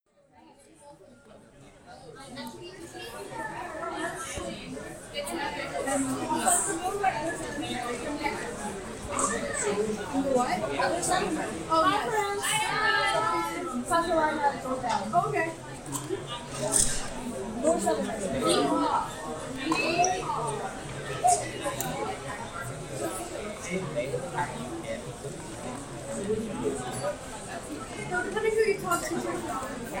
Soundscapes > Indoors
Party Sounds
Sounds of a quite room at a party.
background, Party, speaking